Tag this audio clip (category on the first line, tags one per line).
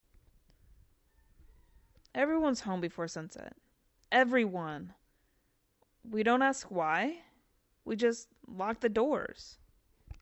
Speech > Solo speech
dystopian
Script
curfew
rules